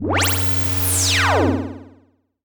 Sound effects > Experimental
Analog Bass, Sweeps, and FX-153
weird, snythesizer, bassy, pad